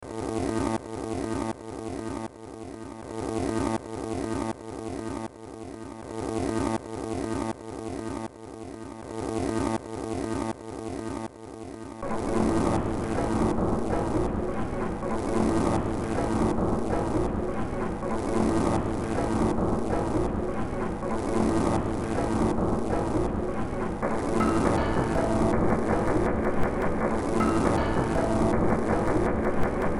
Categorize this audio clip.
Music > Multiple instruments